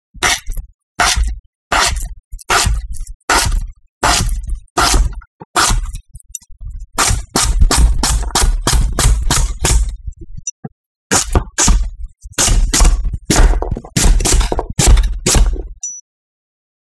Sound effects > Objects / House appliances
Knife slicing a carrot at different speeds - low, medium and fast. Recorded with Zoom H6 and SGH-6 Shotgun mic capsule.